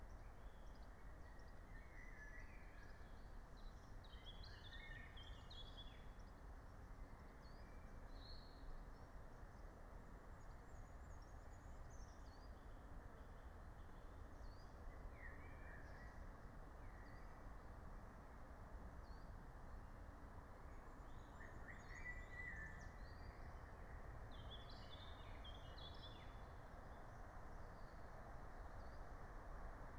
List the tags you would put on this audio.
Soundscapes > Nature
alice-holt-forest meadow natural-soundscape phenological-recording raspberry-pi soundscape

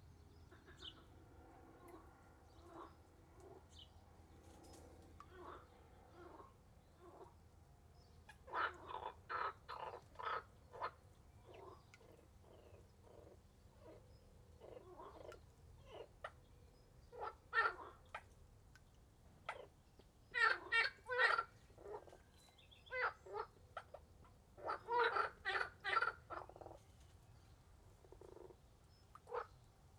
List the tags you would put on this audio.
Soundscapes > Other
field-recording mare frogs grenouilles croak pond st-genis-sur-menthon

